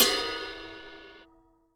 Instrument samples > Percussion
bellride weak 2 very long
Amedia, bassbell, bell, bellride, Bosphorus, crashcup, cupride, cymbal, cymbell, Hammerax, Istanbul, Mehmet, ping, ride, ridebell, Sabian, Soultone, Stagg, Zildjian